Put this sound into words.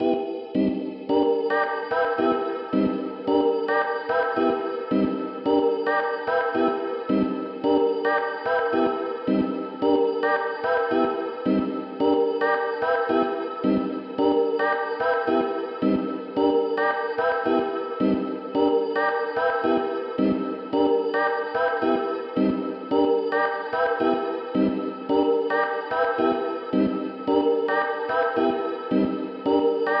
Music > Multiple instruments
128 All won Loop
created with playbox (NI) and itś chord engine.
chords, electronic, evolving, filtered, lead, multi-sample, nativeinstruments, playbox, resonance